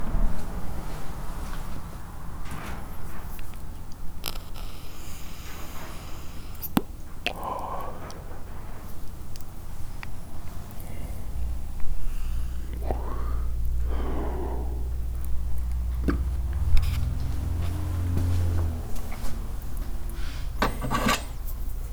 Sound effects > Other mechanisms, engines, machines
Woodshop Foley-055
a collection of foley and perc oneshots and sfx recorded in my workshop
bam, bang, boom, bop, crackle, foley, fx, knock, little, metal, oneshot, perc, percussion, pop, rustle, sfx, shop, sound, strike, thud, tink, tools, wood